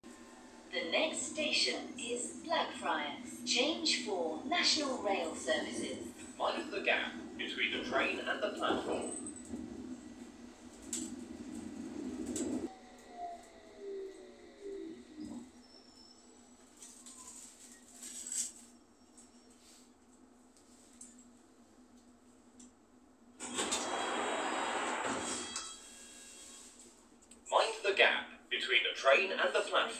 Soundscapes > Urban
Recording inside the train at Blackfriars on District Line. Sounds of standard announcement including the iconic "Mind the gap" at the stop and in between station, sounds of doors opening and closing and ambiant sounds. Recorded on a Samsung A02 phone on May 9, 2025 ; at 10:16 a.m.
ambient, London-underground